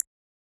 Sound effects > Objects / House appliances
Drop PipetteDripFast 8 ClosedHat
Water being released from a cosmetic pipette into a small glass jar filled with water, recorded with a AKG C414 XLII microphone.